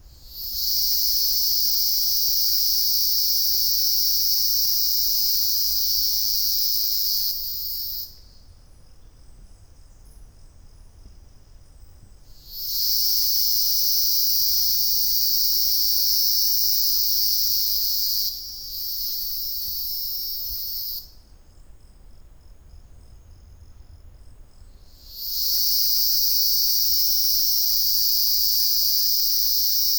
Soundscapes > Nature
Cicadas chirping in the jungle
Cicadas are chirping in the Tenorio National Park in Costa Rica. Recorded with an Olympus LS-14.
jungle, insects, crickets